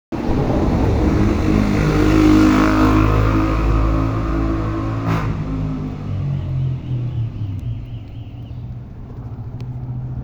Soundscapes > Urban
20250513 0938 motorbike and alarm phone microphone
motorbike and alarm
field, recording, atmophere